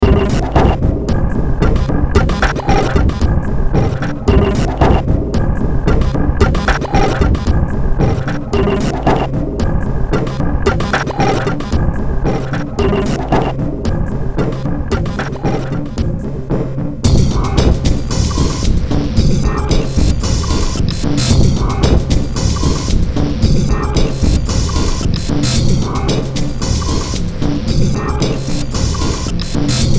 Music > Multiple instruments
Demo Track #3520 (Industraumatic)
Noise, Ambient, Underground, Horror, Soundtrack, Industrial, Games, Sci-fi, Cyberpunk